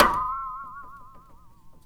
Sound effects > Other mechanisms, engines, machines
Handsaw Pitched Tone Twang Metal Foley 15
foley, fx, handsaw, hit, household, metal, metallic, perc, percussion, plank, saw, sfx, shop, smack, tool, twang, twangy, vibe, vibration